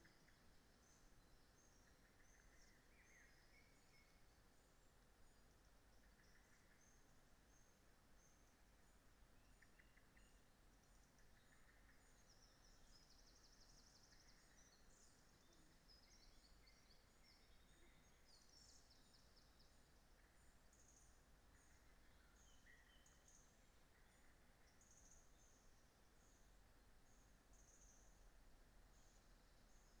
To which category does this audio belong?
Soundscapes > Nature